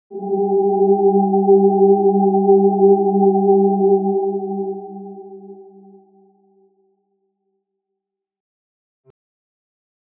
Instrument samples > Synths / Electronic
Deep Pads and Ambient Tones6
Ambient, Analog, bass, bassy, Chill, Dark, Deep, Digital, Haunting, Note, Ominous, Oneshot, Pad, Pads, Synth, Synthesizer, synthetic, Tone, Tones